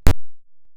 Sound effects > Electronic / Design
Optical Theremin 6 Osc dry-071
noisey, DIY